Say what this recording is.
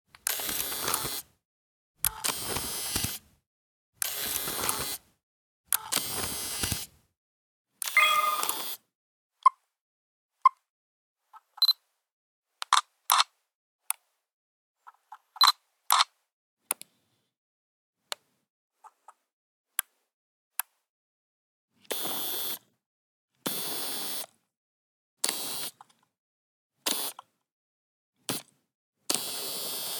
Sound effects > Objects / House appliances
Camera - Cheap Digicam (Lens, AF and Shutter)
A cheap Canon digicam turning on and off, autofocusing, zooming in and out and taking a photo. Recorded with a Zoom H2n, using only the mid microphone (single cardioid condenser)